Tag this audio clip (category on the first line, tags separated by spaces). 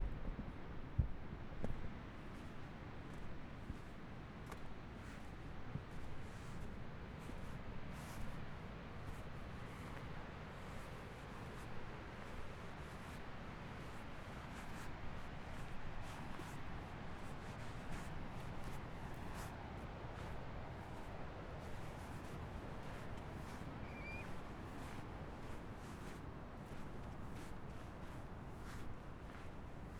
Soundscapes > Nature
beach climbing dunes kids waves